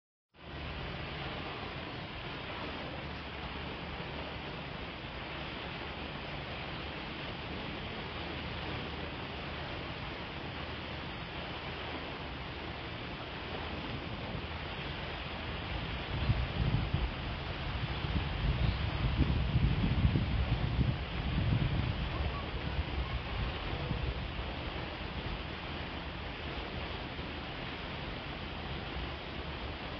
Soundscapes > Nature
1 Hour of Peaceful Fountain Sounds
This is a detailed, high-quality field recording of a park water fountain, captured with my phone (Samsung Galaxy s22). The sound is extracted from the video and focuses on the clear, steady rhythm of the fountain. You’ll hear a full water texture with three main elements: - the rising rush of the pump - the crisp splash at the top - the gentle fall and spread of water hitting the basin This audio naturally includes subtle, non-dominant layers that make the space feel alive without pulling focus from the fountain itself. These includes but not limited to; Birds & wind in trees. Thank you and enjoy!
AmbientSounds, ASMRNature, CalmNature, FountainASMR, MeditationAmbience, NaturalCalm, NatureAmbience, NatureForSleep, ParkRelaxation, PeacefulSoundscape, RelaxingSounds, RelaxingVideo, SleepSounds, SoothingWater, SoundOfWater, StudyAmbience, SunnyDayVibes, TranquilPark, WaterFountain